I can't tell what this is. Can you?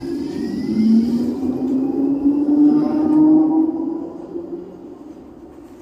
Soundscapes > Urban
final tram 27
tram, finland